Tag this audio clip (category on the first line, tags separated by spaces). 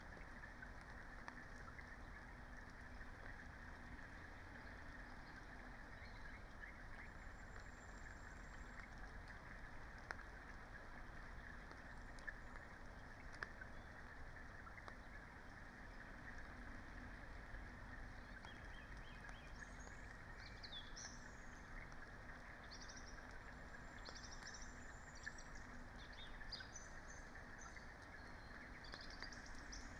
Soundscapes > Nature
phenological-recording raspberry-pi